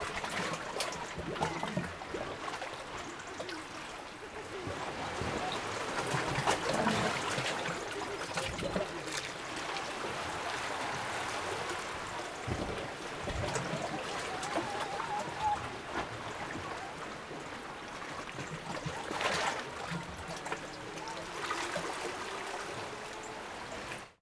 Sound effects > Human sounds and actions
MALLORCA BEACH 01
Recorded on a small beach on the south of the Island. Some waves and some sounds of the water hitting against rocks. People can be heard sometimes down the beach. Recorded with a Zoom H6 and compressed slightly
ambience, beach, foley